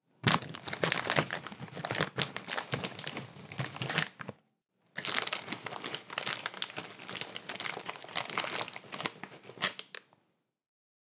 Sound effects > Other
FOODEat Cinematis RandomFoleyVol2 CrunchyBites Food.Bag PuffedCorn Searching Freebie
bite, snack, crunchy, foley, SFX, handling, rustle, recording, postproduction, effects, bites, plastic, texture, sound, bag, food, puffed, crunch, design, corn